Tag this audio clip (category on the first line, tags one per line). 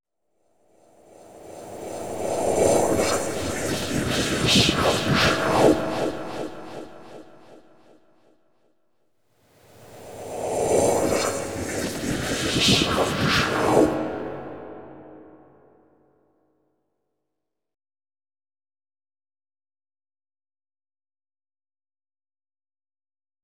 Speech > Processed / Synthetic
Alien
Cinematic
Creepy
Dark
Echo
Ghost
Haunting
Magic
Male
Spell
Wizard